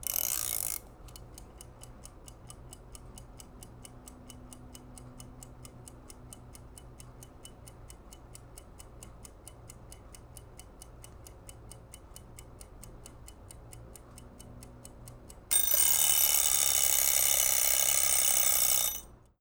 Sound effects > Objects / House appliances
CLOCKMech-Blue Snowball Microphone, CU Cooking Timer Wind Up, Tick, Ring Nicholas Judy TDC
A cooking timer winding up, ticking and ringing.